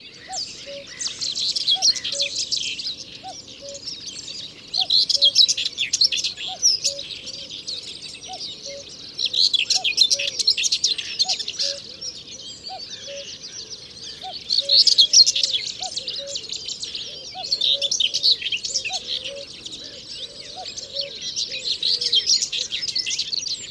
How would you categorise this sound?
Soundscapes > Nature